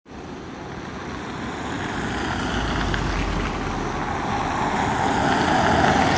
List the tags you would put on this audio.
Sound effects > Vehicles
car
field-recording

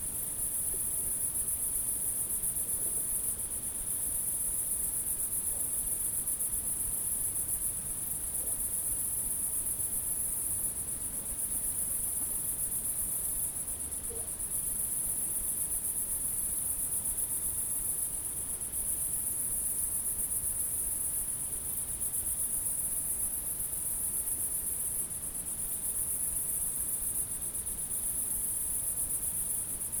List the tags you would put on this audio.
Soundscapes > Nature
Bourgogne
Bourgogne-Franche-Comte
country-side
Gergueil
H2n
night
rural

